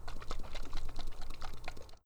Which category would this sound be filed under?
Sound effects > Objects / House appliances